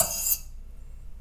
Sound effects > Objects / House appliances
knife and metal beam vibrations clicks dings and sfx-129
Beam, Clang, ding, Foley, FX, Klang, Metal, metallic, Perc, SFX, ting, Trippy, Vibrate, Vibration, Wobble